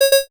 Sound effects > Electronic / Design
OBSCURE ELECTRIC ARTIFICIAL PROMPT
UNIQUE, HIT, HARSH, EXPERIMENTAL, OBSCURE, SHARP, COMPUTER, DING, CIRCUIT, SYNTHETIC, CHIPPY, INNOVATIVE, BOOP, BEEP, ELECTRONIC